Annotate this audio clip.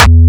Instrument samples > Percussion
Classic Crispy Kick 1- +1octE
brazilianfunk, crispy, distorted, powerful, powerkick